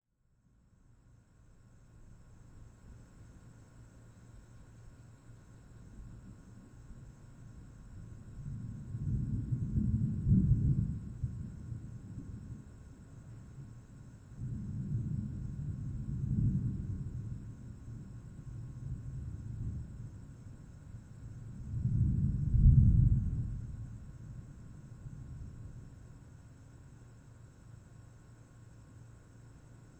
Soundscapes > Urban
250818 230417 PH Thunderstorm in the night
Thunderstorm in the night. I made this recording at about 11:15PM, from the porch of a house located at Santa Monica Heights, which is a costal residential area near Calapan city (oriental Mindoro, Philippines). Apart from the crickets and the neighbour’s air conditioner, you will hear a thunder storm coming, with some deep and long thunder rolls, some rain, as well as the fog horn of a ship living the city harbour (at #2:16), some vehicles passing by in the street, a party in the neighbourhood, and dogs barking in the distance. Recorded in August 2025 with a Zoom H5studio (built-in XY microphones). Fade in/out applied in Audacity.